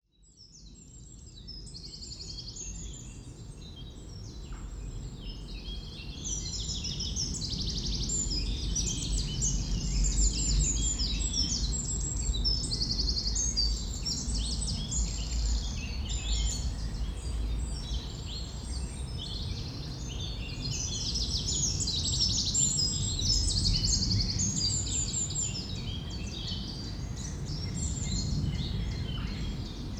Soundscapes > Nature
A field recording at Blithfield Reservoir, Staffordshire. Morning time.
recording, birds, nature, filed